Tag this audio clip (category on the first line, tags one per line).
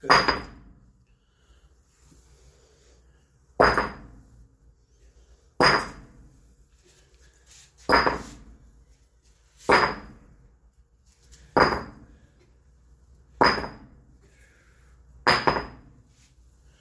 Sound effects > Natural elements and explosions
brick
drop
foley
Phone-recording